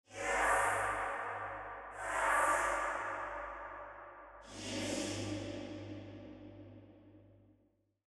Sound effects > Electronic / Design
From a collection of whooshes made from either my Metal Marshmallow Pro Contact Mic, Yamaha Dx7, Arturia V Collection